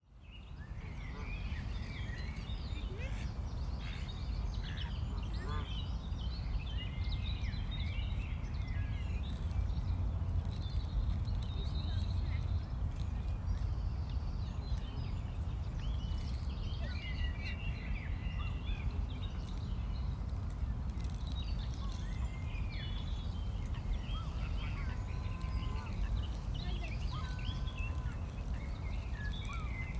Soundscapes > Nature
A recording at RSPB Sandwell Valley, Birmingham. Morning.
ambience, birds, field, recording, rspb